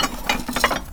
Sound effects > Other mechanisms, engines, machines
metal shop foley -070
fx, pop, metal, percussion, shop, rustle, little, sfx, knock, bang, perc, strike, wood, crackle, thud, tools, bop, tink, sound, boom, bam, foley, oneshot